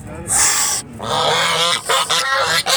Sound effects > Animals
Waterfowl - Chinese Geese; Small Group of Females, Hiss and Honk, Close Perspective
A brown Chinese goose hisses and a group of white female Chinese geese hiss. Recorded with an LG Stylus 2022.